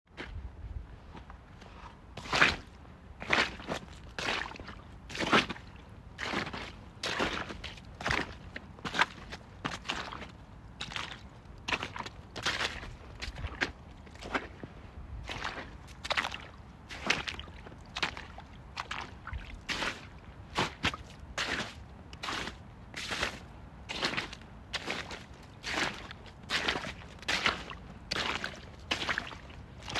Sound effects > Human sounds and actions
Ice-Swamp Walk
Foley of footsteps through ice water/sludge. Could double up as swamp walking audio.
Feet, Foot, footsteps, Rain, sludge, snow, steps, swamp, walk, walking, Wet, winter